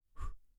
Sound effects > Human sounds and actions
shot blow short 2
blow, bubble, bubbles, foley, game, pomper, sfx, short, shot